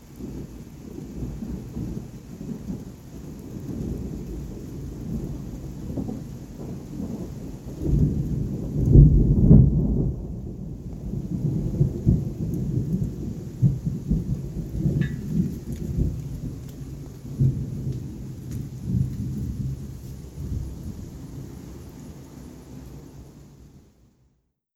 Sound effects > Natural elements and explosions
THUN-Samsung Galaxy Smartphone, CU Thunder, Rumble, Muffled, LFE Nicholas Judy TDC
A muffled lfe thunder rumble.
lfe, muffled, Phone-recording, rumble, thunder